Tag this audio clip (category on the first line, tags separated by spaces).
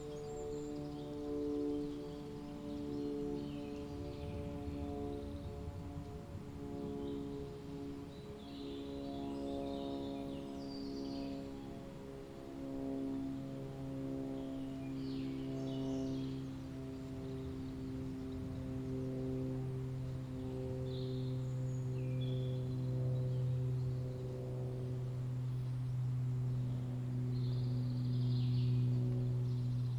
Soundscapes > Nature
artistic-intervention data-to-sound natural-soundscape nature phenological-recording raspberry-pi weather-data